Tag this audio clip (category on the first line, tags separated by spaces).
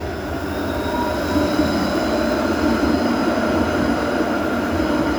Sound effects > Vehicles
Tram Transportation Vehicle